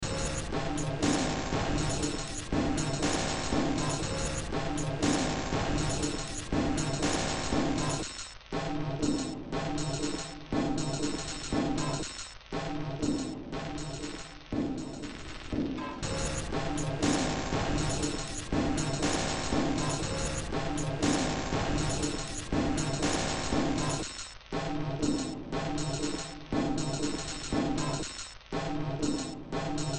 Music > Multiple instruments
Demo Track #3372 (Industraumatic)
Games, Sci-fi, Soundtrack, Ambient, Noise, Horror, Industrial, Underground, Cyberpunk